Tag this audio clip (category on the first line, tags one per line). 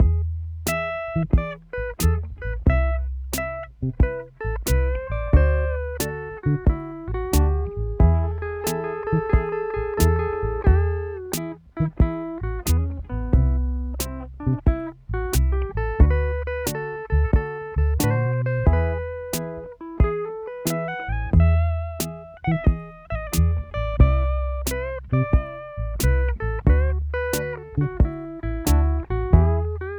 Music > Multiple instruments
funk
groovie
Music
small